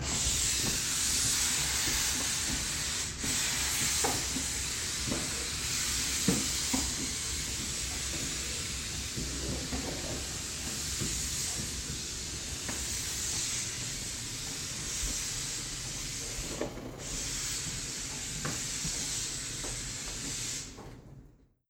Sound effects > Objects / House appliances
MACHAppl-Samsung Galaxy Smartphone, MCU Wagner SteamCleaner, Cleaning Floor Nicholas Judy TDC
A Wagner SteamCleaner cleaning the floor.